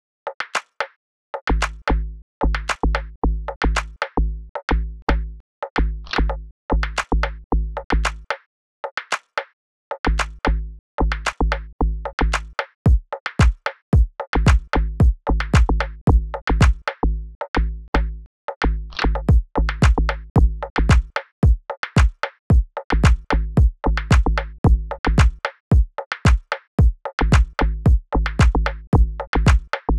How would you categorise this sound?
Instrument samples > Percussion